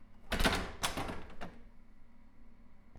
Sound effects > Objects / House appliances
Recycling room door closing
This is a (wooden) door to our apartment building's recycling room being closed.
door, doors, opening, recycling, room